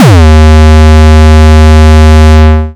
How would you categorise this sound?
Instrument samples > Percussion